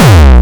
Instrument samples > Percussion
Old School Kick 2 140 bpm
Retouched the Ekit kick in Flstudio. Processed with Zl EQ and Waveshaper. A simple kick I made, and enjoyable music you make :).